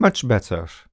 Speech > Solo speech
Relief - Much better
better, dialogue, FR-AV2, happy, Human, Male, Man, Mid-20s, much, Neumann, NPC, oneshot, releif, Relief, singletake, Single-take, talk, Tascam, U67, Video-game, Vocal, voice, Voice-acting